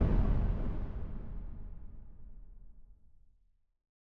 Sound effects > Electronic / Design
GRAND BURIED RATTLING BOOM
SIMPLE, RATTLING, DEEP, LAYERING, BASSY, DIRECT, EXPLOSION, IMPACT, BOOM, DESIGN, HIT, RUMBLING, EDITING, LOW